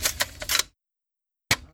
Sound effects > Objects / House appliances
TOYMisc-Samsung Galaxy Smartphone, CU Gun, Cock, Load Nicholas Judy TDC
A toy gun cocking and loading. Recorded at Goodwill.
Phone-recording, cock